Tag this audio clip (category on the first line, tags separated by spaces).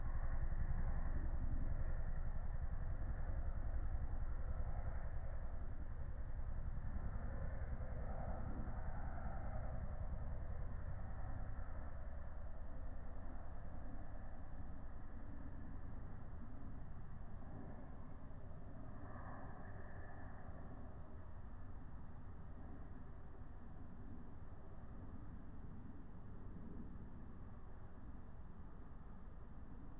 Soundscapes > Nature
meadow natural-soundscape nature raspberry-pi